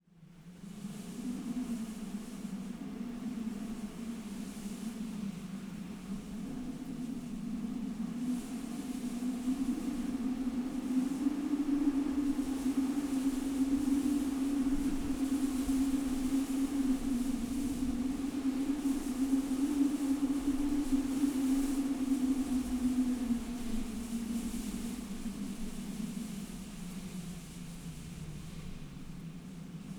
Soundscapes > Nature
Wind singing
Wind moving through electricity cables produces a howling, ghostly, and eerie effect. Field recording, taking advantage of the electric wires acting as an instrument's strings, as the wind rushes through them.
eerie, field, ghostly, haunting, howling, recording, singing, spooky, wind